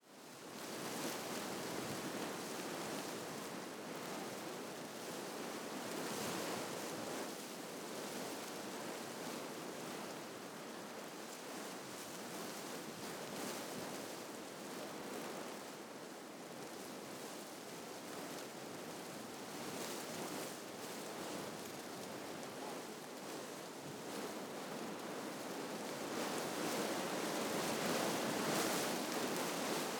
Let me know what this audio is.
Sound effects > Natural elements and explosions
gusts on a brush
brush, fifeld-recording, gale, gust, gusts, weather, wind, windy